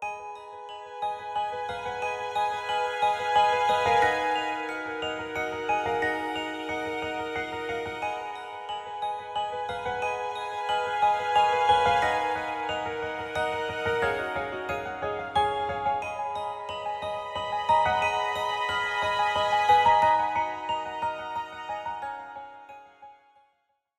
Music > Multiple instruments
Mysterium (Music Sample)
enchanted-forest-theme, enchanting-music, orchestral-fantasy-music, enchanted-forest-music, orchestral-fantasy-theme, mysterious-forest-theme, magical-forest-theme, orchestral, fantasy-podcast-theme, fantasy-tune, rpg-theme, music-box-theme, rpg-orchestral-theme, magical-theme, high-fantasy-theme, high-fantasy-music, mysterious-theme, orchestral-background-music, fantasy-theme, fantasy-rog-composition, mysterious-fantasy-theme, fantasy-bgm, fantasy-rpg-bgm, fantasy-music, fantasy-rpg-theme, orchestral-fantasy-rpg-theme, fantasy-music-box, music-box, magical-forest-music